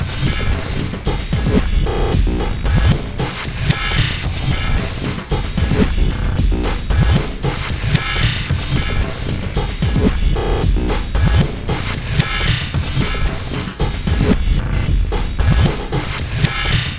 Instrument samples > Percussion
This 113bpm Drum Loop is good for composing Industrial/Electronic/Ambient songs or using as soundtrack to a sci-fi/suspense/horror indie game or short film.
Samples, Soundtrack, Loop, Dark, Underground, Industrial, Ambient, Weird, Drum, Packs, Loopable, Alien